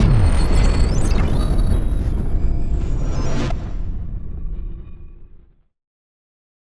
Sound effects > Other
Sound Design Elements Impact SFX PS 115
All samples used in the production of this sound effect are field recordings that I recorded myself. I mixed the field samples with samples designed in the ASM Hydrasynth Deluxe synthesizer. Field recording equipment: Tascam Portacapture x8 and microphone: RØDE NTG5. Samples of various kick types recorded by me and samples from the ASM Hydrasynth Deluxe were layered in Native Instruments Kontakt 8, and then final audio processing was performed in REAPER DAW.
force, shockwave, explosion, percussive, hard, power, audio, design, rumble, heavy, cinematic, effects, strike, blunt, transient, sound, hit, sharp, smash, game, impact, sfx, collision, thudbang, crash